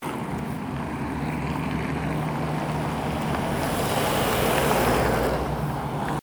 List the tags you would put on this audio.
Soundscapes > Urban
Car CarInTampere vehicle

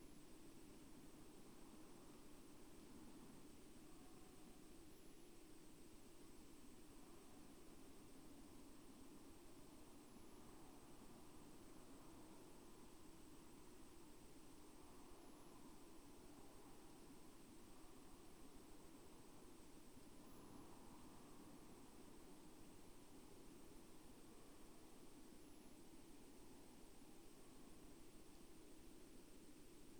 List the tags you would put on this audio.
Soundscapes > Nature
field-recording nature sound-installation weather-data natural-soundscape data-to-sound raspberry-pi modified-soundscape alice-holt-forest soundscape artistic-intervention Dendrophone phenological-recording

